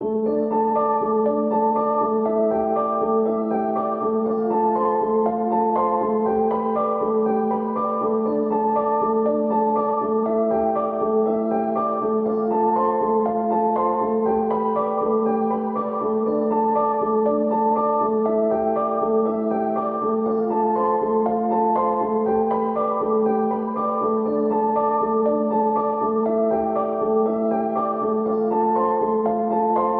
Music > Solo instrument
Piano loops 080 efect 4 octave long loop 120 bpm
pianomusic, free, simplesamples, 120bpm, music, piano, reverb, 120, simple, loop, samples